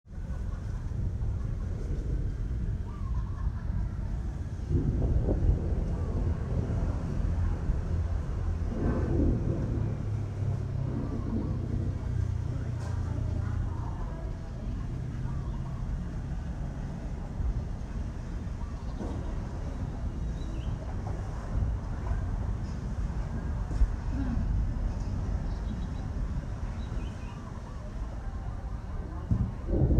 Soundscapes > Other
Âm Thanh Trưa Xung Quanh Đình Bình Phú - Sound around Đình Bình Phú
Sound around Đình Bình Phú. Record use iPhone 7 Plus smart phone 2025.10.14 14:55
nature, noon, environment